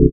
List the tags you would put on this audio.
Instrument samples > Synths / Electronic
fm-synthesis additive-synthesis

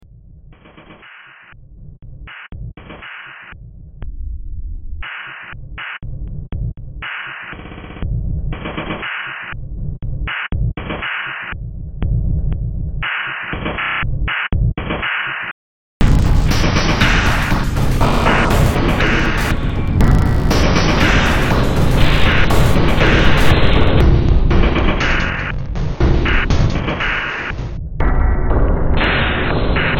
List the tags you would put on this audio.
Music > Multiple instruments
Underground Cyberpunk Horror Soundtrack Games Noise Sci-fi Industrial Ambient

